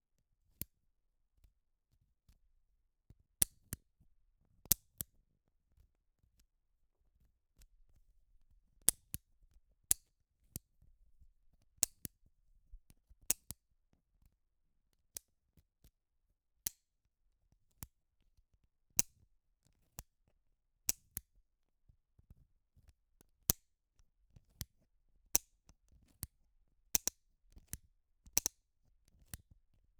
Sound effects > Objects / House appliances
Bic 4 in 1 pen
Subject : Sounds for a Bic 4in1 pen, clicking and unscrewing the thing. Recorded at different distances, sometimes focusing on the tip of the pen or the top end, and dampening the click with my fingers. Date YMD : 2025 06 08 Location : Albi 81000 Tarn Occitanie France. Indoors Hardware : Tascam FR-AV2, Rode NT5. Weather : Night time Processing : Trimmed in Audacity.